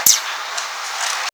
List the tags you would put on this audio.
Sound effects > Objects / House appliances
band
boing
doing
lofi
noise
rubber
rubberband
twang
vintage

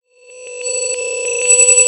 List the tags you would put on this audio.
Instrument samples > Synths / Electronic
onenote
synth
vintage
lo-fi
warp
tape
warped

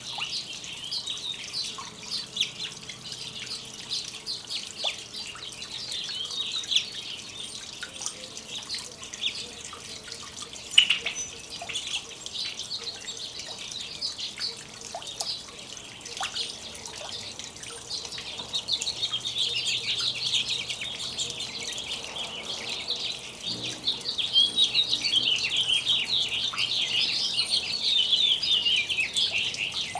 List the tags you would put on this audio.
Soundscapes > Nature
field-recording
buzzing
wings
bees
fountain
flapping

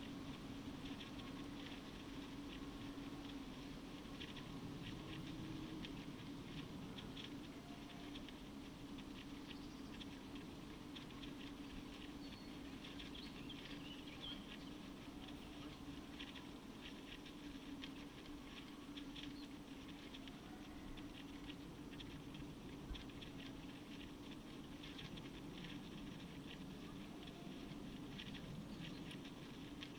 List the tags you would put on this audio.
Soundscapes > Nature
natural-soundscape
artistic-intervention
nature
soundscape
sound-installation
data-to-sound
Dendrophone
field-recording
raspberry-pi
modified-soundscape
weather-data
phenological-recording
alice-holt-forest

